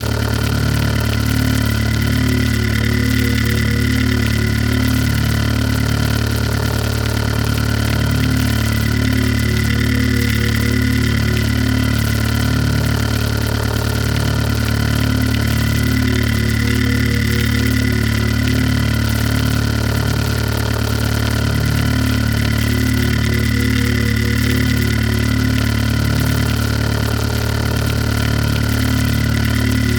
Sound effects > Experimental
SCIEnrg Forcefield Hum Aggresive Enemy
aggresive forcefield/shield hum type sound made with a couple instances of serum 2. 140 bpm cycle.